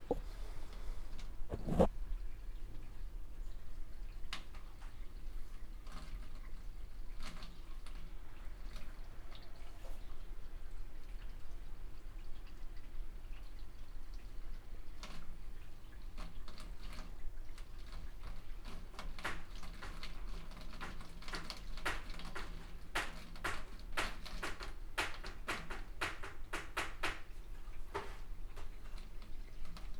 Sound effects > Other
Water dripping on my ceiling
Some water apparently dripping inside my house's ceiling, creating a river like sound. My roommate makes some noise in the beginning. Recorded with the integrated microphones on a Zoom H5, stereo settings.
dripping, h5, water